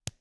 Sound effects > Experimental
Torturing onions. Can be useful to design punch sounds. Recorded with Oktava MK 102.
bones, foley, onion, punch, thud, vegetable